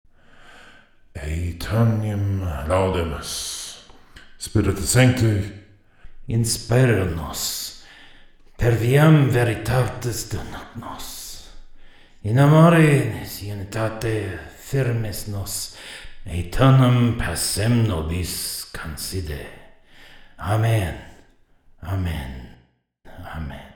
Speech > Solo speech
Creepy Latin Speech 1 Prayer or Summoning Mid Deep Voice
Mid deep voice saying random Latin words, praying, summoning, spell, chanting. Made for a story.
spooky, Gothic, sinister